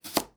Sound effects > Other
Hard chop vegetable 2
Chief, Chop, Cook, Cooking, Cut, House, Household, Indoors, Kitchen, Knife, Slice, Vegetable